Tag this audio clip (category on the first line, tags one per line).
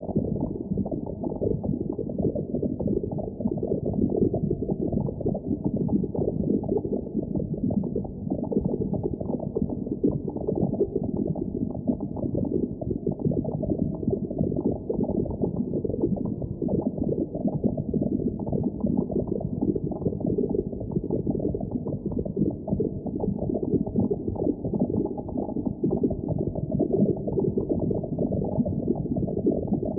Soundscapes > Nature
Droped
Water
bubble
Drown